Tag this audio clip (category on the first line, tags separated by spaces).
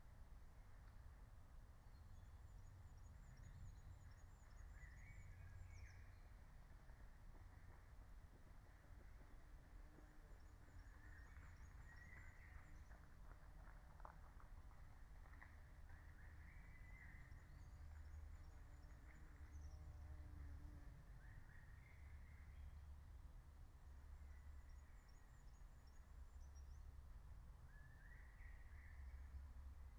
Soundscapes > Nature
alice-holt-forest phenological-recording nature raspberry-pi natural-soundscape soundscape meadow field-recording